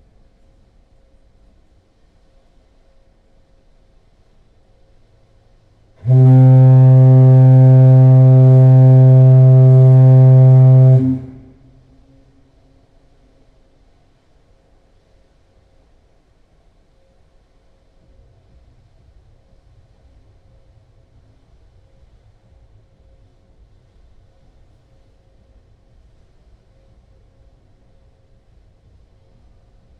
Sound effects > Other mechanisms, engines, machines
The recorder was left under the horn at the front of the ship. It was as LOUD as you'd expect it to be! The ship was in the North sea so there's not much reverb.